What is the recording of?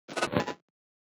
Sound effects > Electronic / Design
Glitch (Faulty Core) 7
computer-error-sound, error-sound-effect, audio-glitch, glitch-sound, glitches-in-me-britches, machine-glitch-sound, computer-glitch-sound, glitch-sound-effect, audio-glitch-sound-effect, computer-glitch-sound-effect, error-fx, machine-glitch, ui-glitch, computer-error, ui-glitch-sound, audio-glitch-sound, machine-glitching, ui-glitch-sound-effect, computer-glitch